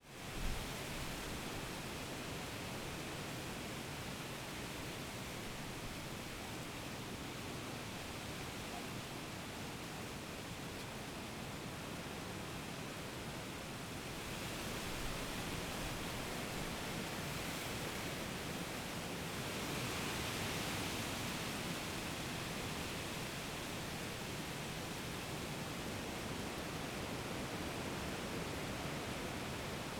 Soundscapes > Nature
Leaves rustling
Recorded using a ZOOM H2essential recorder on a windy day.